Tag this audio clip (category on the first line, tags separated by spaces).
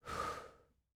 Speech > Solo speech
mid-20s VA